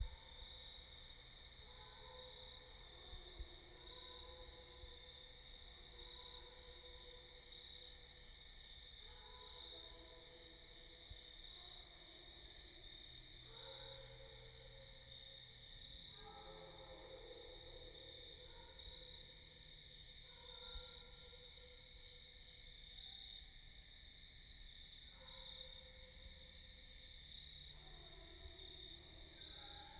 Soundscapes > Nature
Crickets & Creepy Dogs Howling at Night
Sounds of Crickets at night, along with dogs making creepy howling, barking sounds in the distance. Recorded with a ZOOM H6 and a Sennheiser MKE 600 Shotgun Microphone. Go Create!!!
dogs, creepy, eerie, scary, crickets, night, horror, howling, spooky